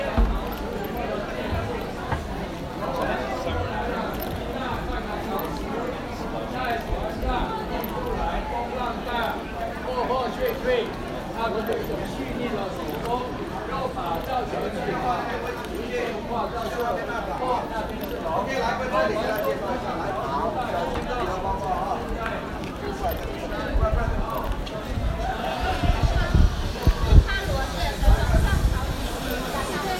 Soundscapes > Urban
Street Ambience near Wat Phra Kaew, Bangkok, Thailand (Feb 23, 2019)
Field recording near Wat Phra Kaew, Bangkok, Thailand, on February 23, 2019. Captures temple surroundings, street sounds, tourists, and local atmosphere.
street, ambient, Bangkok, tourists, Kaew, Wat, Thailand, urban, soundscape, Phra, temple